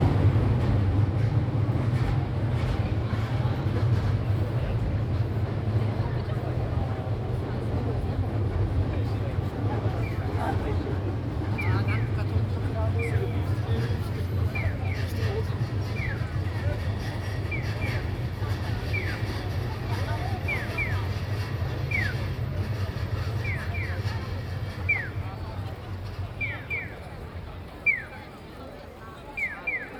Soundscapes > Urban
passadeira IV
Recorded with an old Zoom H2N, crossing Ueno, in Tokyo.
cityscape, crossing, field-recording, japan, tokyo, ueno